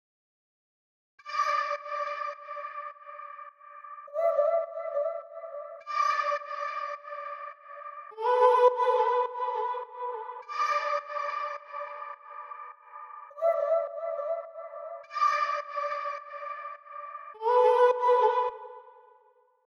Speech > Solo speech
Vocal 104 bpm Vst Abstract Vox Fantastic vocal DMin
Loop, bpm, 104, Vox, Vocal, Abstract